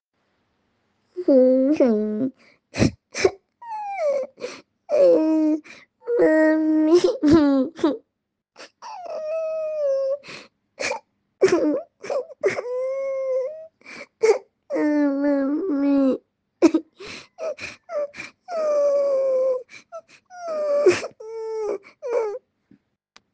Speech > Solo speech
Young child or toddler crying
This is Dr Yemisi Ekor imitating a young child crying. Recorded in a room with minimal background sound on my iPhone 12, no processing. We use this sound to enhance realism of healthcare simulations. First used University of Ghana Medical Centre MTSC for SimPACT May 2025.
simulation, child, crying